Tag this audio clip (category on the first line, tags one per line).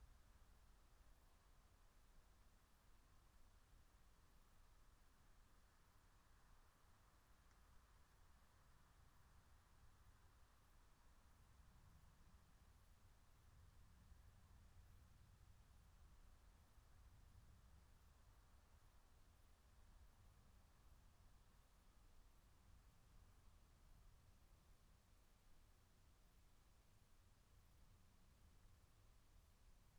Soundscapes > Nature
meadow
raspberry-pi